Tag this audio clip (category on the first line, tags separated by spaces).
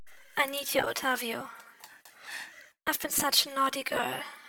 Speech > Solo speech
naughty girl sexy woman